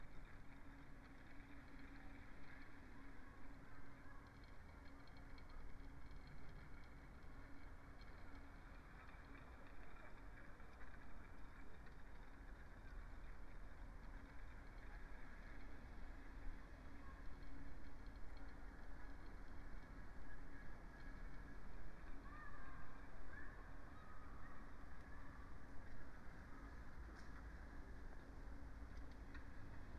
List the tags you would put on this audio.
Soundscapes > Nature
natural-soundscape
artistic-intervention
raspberry-pi
phenological-recording
sound-installation
weather-data
modified-soundscape

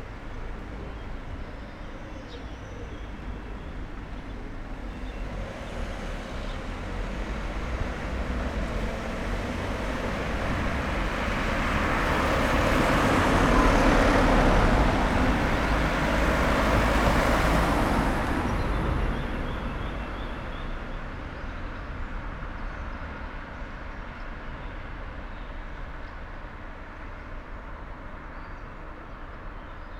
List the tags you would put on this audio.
Soundscapes > Urban
2025
81000
Albi
bike
bus
car
cars
City
Early
Early-morning
France
FR-AV2
hand-held
handheld
intersection
Mono
morning
NT5
Occitanie
Outdoor
Rode
Saturday
Single-mic-mono
Tarn
Tascam
traffic
urbain
Wind-cover
WS8

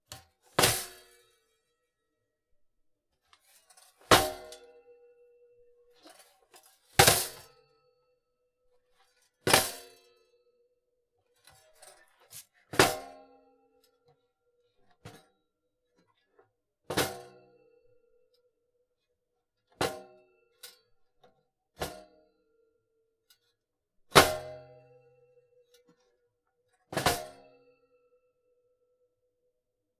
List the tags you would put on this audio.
Sound effects > Objects / House appliances
impact
metal
toaster

